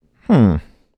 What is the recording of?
Speech > Solo speech
Doubt - Humm
dialogue, doubt, FR-AV2, Human, Male, Man, Mid-20s, Neumann, NPC, oneshot, singletake, Single-take, skeptic, skepticism, sound, talk, Tascam, U67, Video-game, Vocal, voice, Voice-acting